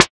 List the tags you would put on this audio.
Instrument samples > Synths / Electronic
additive-synthesis bass

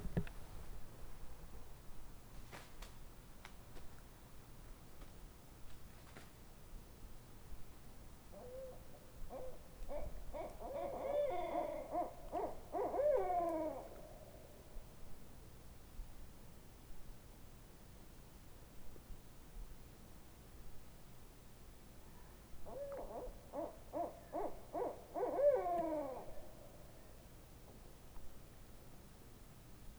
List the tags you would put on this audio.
Soundscapes > Nature
owls owl hooting field-recording bird night

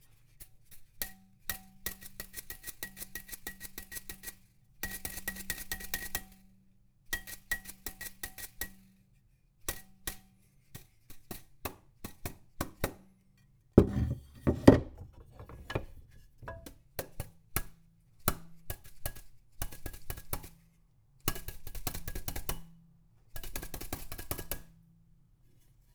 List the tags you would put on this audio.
Sound effects > Objects / House appliances
bristle bristles brush brushing delicate paint paintbrush sfx shop soft surface wiping

